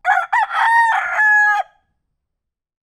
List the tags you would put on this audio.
Sound effects > Animals
chicken
farm
morning
countryside
cock-a-doodle-doo
field-recording
rooster
SFX
Philippines
Santa-Rosa
atmosphere
ambience

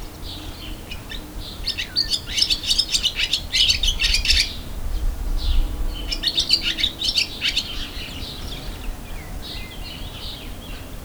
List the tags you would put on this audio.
Sound effects > Animals
XY
Spring
Ambience
April
2025
bird
Rural
Outdoor
Gergueil
H5
Village
Zoom

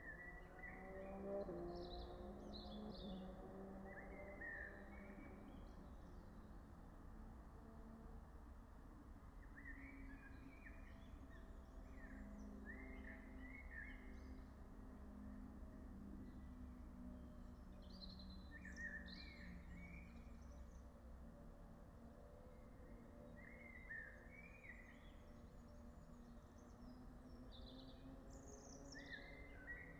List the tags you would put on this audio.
Soundscapes > Nature
phenological-recording
alice-holt-forest

